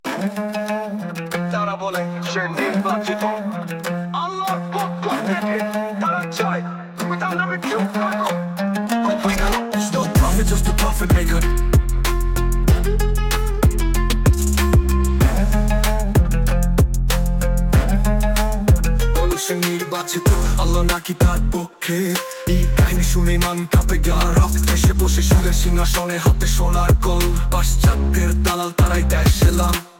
Speech > Solo speech
A Bengali political commentary audio titled "সুদের সিংহাসনে কে", raising questions about the power structure behind interest-based financial systems. #0:12 starts the main dialogue. Ideal for awareness campaigns, political education, or sound sampling in multimedia projects.